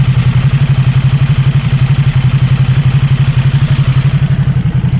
Sound effects > Other mechanisms, engines, machines
puhelin clip prätkä (6)
Ducati, Motorcycle, Supersport